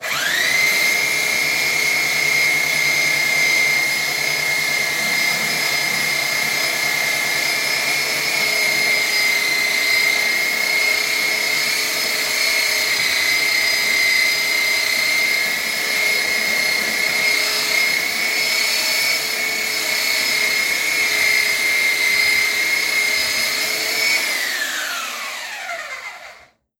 Sound effects > Objects / House appliances
TOOLPowr-Samsung Galaxy Smartphone, CU Sander, Sanding Wood Nicholas Judy TDC
A sander sanding wood.